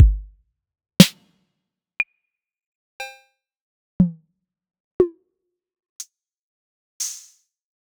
Instrument samples > Percussion
Lucia Drum Kit #011
cowbell, drum, hihat, kick, kit, percussion, rimshot, snare, synth, thwack, tom, woodblock